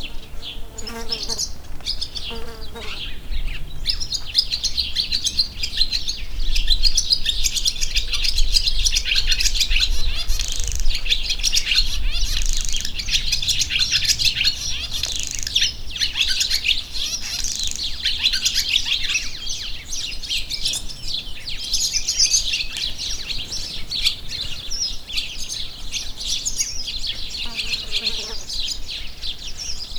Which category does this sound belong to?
Sound effects > Animals